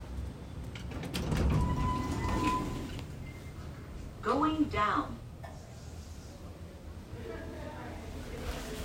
Sound effects > Other mechanisms, engines, machines
Elevator Doors and recorded voice "Going Down"
Elevator pre-recorded voice saying "Going Down", preceded by sound of elevator doors closing. Recorded at the Doubletree hotel on I-75 in Dallas, Texas. Voices outside the elevator can also be heard.
elevator, doors